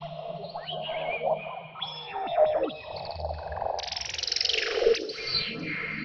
Soundscapes > Synthetic / Artificial
LFO Birdsong 47
LFO, massive